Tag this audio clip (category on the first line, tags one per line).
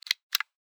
Sound effects > Human sounds and actions
activation
button